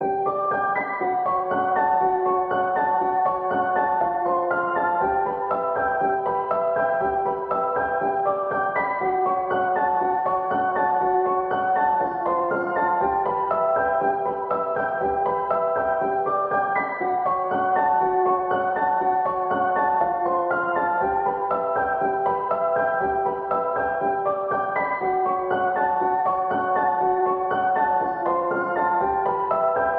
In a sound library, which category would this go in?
Music > Solo instrument